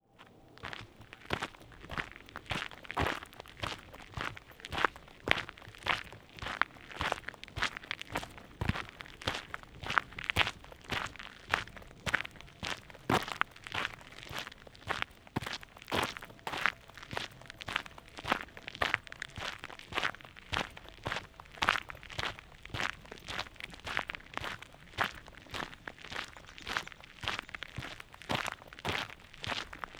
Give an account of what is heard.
Sound effects > Human sounds and actions
A recording of me walking outside on a gravel path in a pair of walking boots.
foley, footsteps, outdoor, recordings, walking